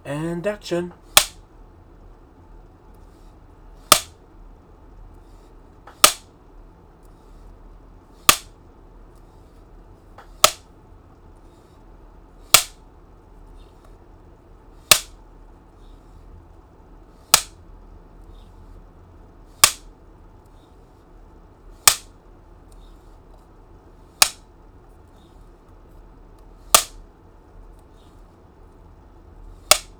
Sound effects > Objects / House appliances
OBJMisc-Blue Snowball Microphone, CU Clapperboard, Hits Nicholas Judy TDC
'And action!', Multiple clapperboard hits.